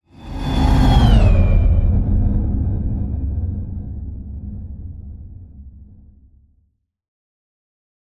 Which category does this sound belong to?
Sound effects > Other